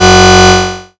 Instrument samples > Synths / Electronic
DRILLBASS 1 Gb
additive-synthesis, bass, fm-synthesis